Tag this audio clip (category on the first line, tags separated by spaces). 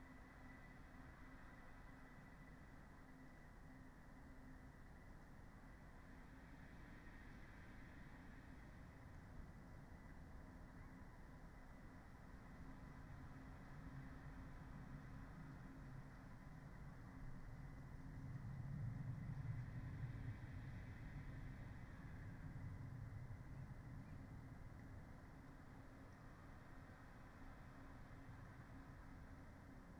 Soundscapes > Nature
nature weather-data field-recording Dendrophone natural-soundscape raspberry-pi artistic-intervention modified-soundscape soundscape data-to-sound phenological-recording alice-holt-forest sound-installation